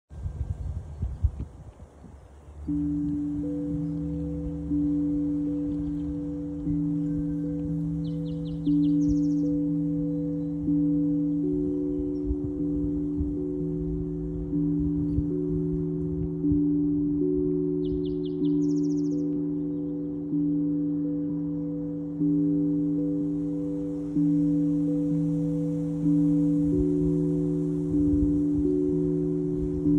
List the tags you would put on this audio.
Music > Solo instrument
Dreamscape
Drum
Electronic

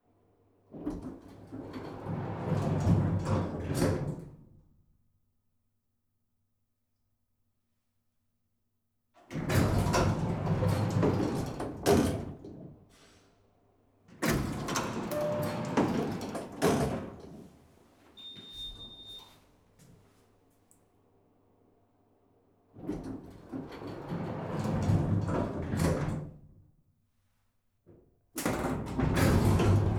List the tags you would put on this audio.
Sound effects > Other mechanisms, engines, machines
closing
door
open

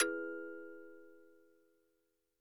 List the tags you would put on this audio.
Instrument samples > Percussion
glock
sound-effect
cinematic
ting
1lovewav
perc

